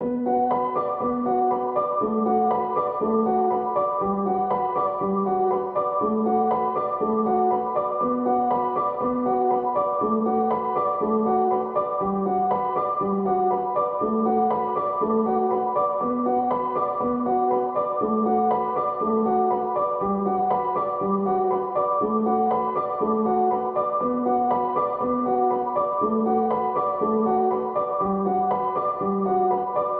Music > Solo instrument
Piano loops 126 efect 4 octave long loop 120 bpm
120, 120bpm, free, loop, music, piano, pianomusic, reverb, samples, simple, simplesamples